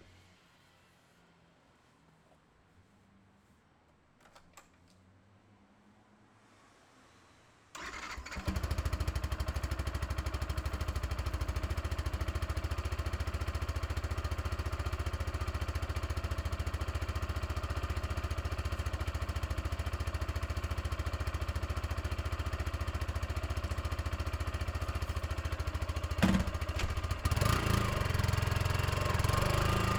Sound effects > Other mechanisms, engines, machines
Motorcycle Sounds recorded with rode wireless go, mic sticked on to the motorcycle.